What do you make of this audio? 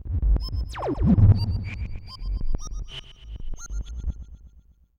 Sound effects > Experimental
Analog Bass, Sweeps, and FX-116
alien, analogue, bass, bassy, complex, dark, electronic, fx, korg, oneshot, pad, retro, sci-fi, scifi, sfx, synth, trippy, vintage